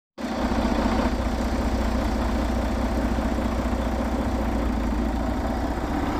Sound effects > Vehicles
final bus 22
Bus Sound captured on iphone 15 Pro.
bus, finland